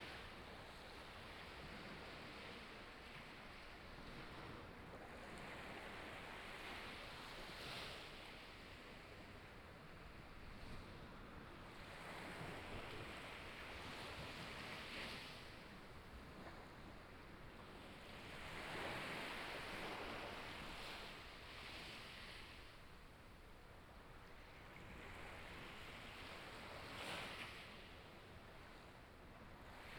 Soundscapes > Nature
Calm Sea Waves4 - Japan - Binaural
Recorded waves on a beach in a small quiet town in Japan. Recorded with: Zoom H5 Soundman OKM2 Classic
Beach, Binaural, Field-recording, Japan, Sea, water, Waves